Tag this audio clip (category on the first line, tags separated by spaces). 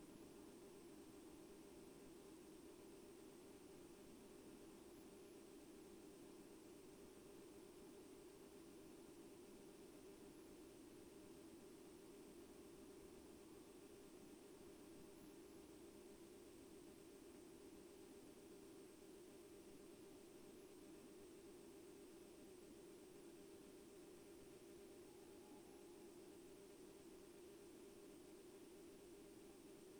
Soundscapes > Nature
phenological-recording weather-data field-recording Dendrophone natural-soundscape artistic-intervention raspberry-pi data-to-sound sound-installation alice-holt-forest soundscape nature modified-soundscape